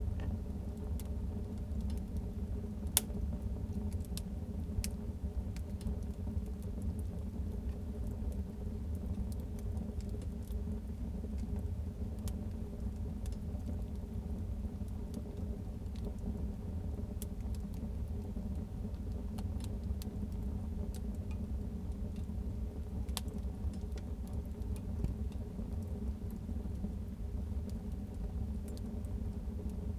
Sound effects > Natural elements and explosions
The fire in the oven. Recorded on the zoom H1n recorder